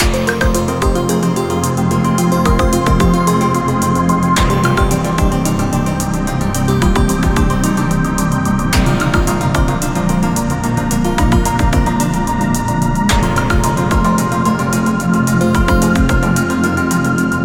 Music > Multiple instruments
Sci-Fi Loop (Proplanetary, 110 bpm, 8 bars)
Don't think it's particularly good compared with my more recent current stuff, but perhaps someone will find good use of it. The timbre and speed of this version are a bit different than with the first one.
sci-fi-intro, energetic-music-loop, upbeat-sci-fi-music-loop, 110-bpm, ambient-music-loop, sci-fi-110-bpm-beat, sci-fi-music-theme, upbeat-sci-fi-loop, 110-bpm-loop, sci-fi-podcast, sci-fi-outro, 8-bar-loop, sci-fi-110-bpm-loop, sci-fi-ambient-music, sci-fi-110-bpm-music-loop, music-loop, sci-ambient-theme, sci-fi-loop, sci-fi-music-loop, sci-fi-beat, 110-bpm-8-bar-loop